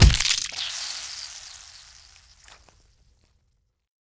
Sound effects > Electronic / Design
Skull Split #3
This one is the loudest, longest, and features more blood splatter on the tail.
head-crack-attack, bludgeoning-hit, skull-shatter-hit, bludgeoning-attack, stomp-on-head, head-smash-attack, smash-head